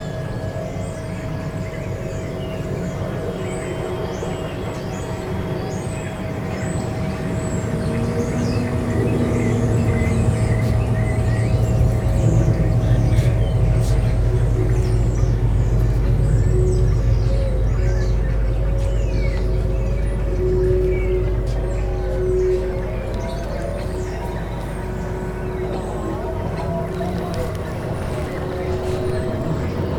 Soundscapes > Indoors
Space, Birds, Urban, Birds and Voices - Biennale Exhibition Venice 2025
Space, birds, urban, voices and birds Sound recorded while visiting Biennale Exhibition in Venice in 2025 Audio Recorder: Zoom H1essential